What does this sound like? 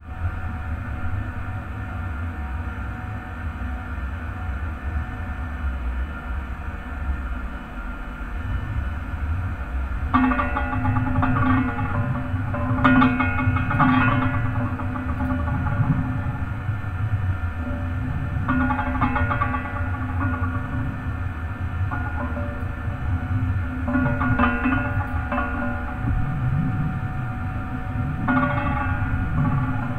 Soundscapes > Urban
AMBUrbn-Contact Mic Cell Phone Tower SoAM Sound of Solid and Gaseous Pt 1
contact, phone, technica, telephone